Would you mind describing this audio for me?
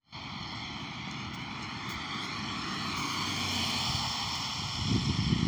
Sound effects > Vehicles
car passing 2
Sound of a car passing by in wet, cool weather, with winter tires on the car. Recorded using a mobile phone microphone, Motorola Moto G73. Recording location: Hervanta, Finland. Recorded for a project assignment in a sound processing course.
vehicle, drive